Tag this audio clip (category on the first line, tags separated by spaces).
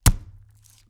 Sound effects > Experimental
bones foley onion punch thud vegetable